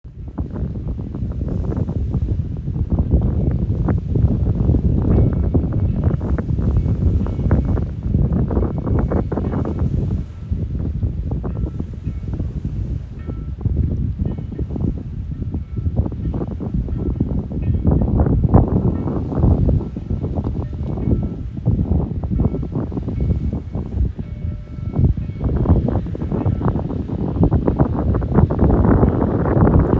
Sound effects > Natural elements and explosions
Wind with Surf and Bell Buoy
Loud wind in the foreground with distant surf hitting the beach, as well as an even more distant bell buoy. Recorded at Menemsha Beach on Martha's Vineyard, right next to Meneshma Harbor where Quint's shack was located in the 1975 film "Jaws".
beach, coast, sea, shore, surf, waves